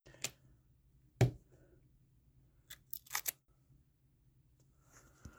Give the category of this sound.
Sound effects > Objects / House appliances